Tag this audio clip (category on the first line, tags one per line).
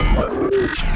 Sound effects > Electronic / Design
hard
one-shot
glitch